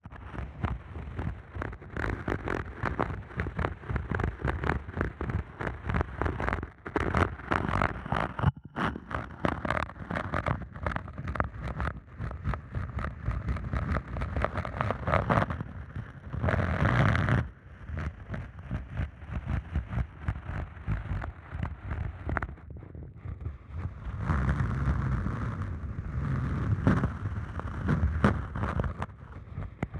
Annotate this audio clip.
Sound effects > Other
I used the Jez Riley French 'Ecoutic' contact microphone with probe to record the probe moving along the outside of an orange.
Contact, friction, Mic, movement, Scratch, scrathing